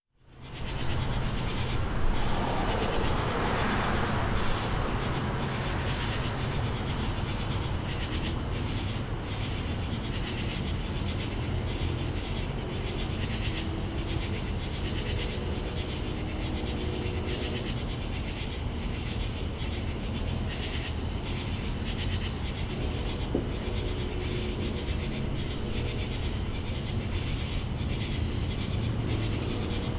Soundscapes > Urban
AMBSubn-Summer Neighborhood at Night, crickets, trains, passing traffic, Gampa and Gandy's Porch QCF Tarrant City Alabama
Front Porch of my Gampa and Gandy's house in Tarrant City, Alabama on a hot summer night. Crickets, passing traffic.
Crickets, neighborhood, Night, Summer, Traffic